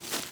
Sound effects > Natural elements and explosions
Boot footstep on grass and leaves
Movement on grass and leaves wearing a boot. Recorded with a Rode NTG-3.
boot, foliage, foot, footstep, footsteps, grass, ground, leaf, leaves, long-grass, step, steps, walk, walking